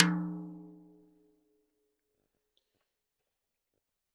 Music > Solo percussion
Hi Tom- Oneshots - 10- 10 inch by 8 inch Sonor Force 3007 Maple Rack
beat, beats, drumkit, hi-tom, instrument, percussion, rimshot, roll, tomdrum, toms, velocity